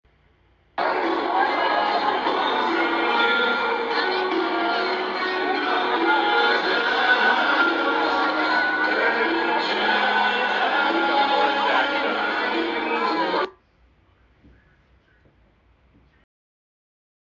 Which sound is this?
Sound effects > Human sounds and actions
concert line
waiting in line while Staind plays.